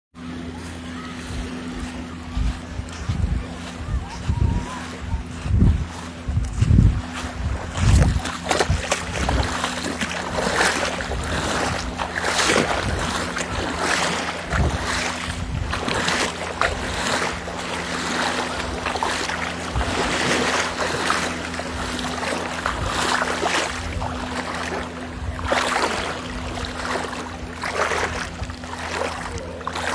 Soundscapes > Nature
The Sea at Beresford, August 10, 2025
A walk along the shore line at low tide. People are wading in the water, children are laughing and very little wind.
asmr, beach, crowd, field-recording, laughter, ocean, sea, seaside, shore, water